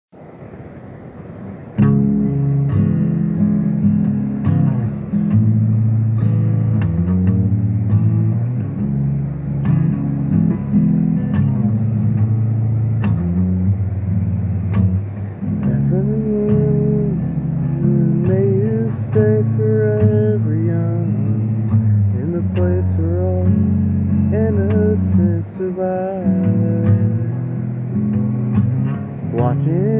Music > Solo instrument
TC Birthday Song
Song I'm writing for a girl who's driving hundreds of miles just to see me on my birthday... Jarededidiah, if you're out there, hearing this... thank you. and all of you listening, godspeed. recorded on samsung galaxy a21..... mastered in ableton live 12. ~~~ Plugins. pro q 3 pro c 3 triangular wave dithering. complex sound algorithms, bass boosting technologies. ~~~~~ Recorded with the sound of birds present.. use cases: end of movie, dramatic scene, podcast intro. Free to all you, the people. Ta, darling. much love -McCrowley